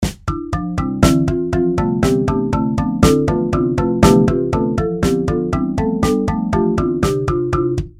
Music > Multiple instruments
Coconut dance!! Enjoy!